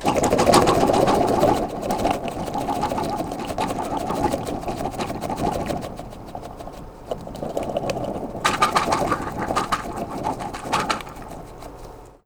Sound effects > Objects / House appliances
TOONWarb-Blue Snowball Microphone, CU Warble, Squishy, Watery Nicholas Judy TDC
A squishy watery warble.